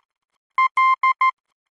Sound effects > Electronic / Design
A series of beeps that denote the letter L in Morse code. Created using computerized beeps, a short and long one, in Adobe Audition for the purposes of free use.